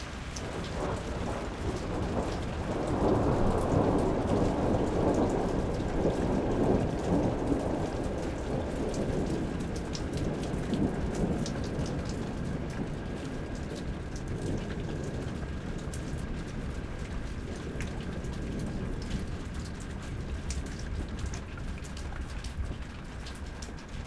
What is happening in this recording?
Sound effects > Natural elements and explosions
RAIN Thunder gutter flowing water drips
Moderate rain on a metal roof recorded from the outside with water drips into puddles and thunder roll recorded with a Zoom H6